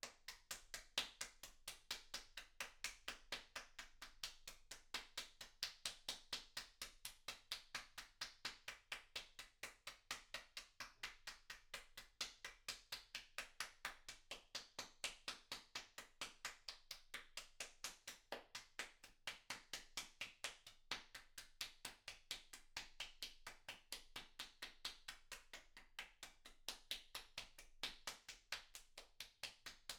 Sound effects > Human sounds and actions
Applause 8 (clapping arm)
Applaud, clap, clapping, FR-AV2, individual, Rode, solo, Solo-crowd, Tascam, XY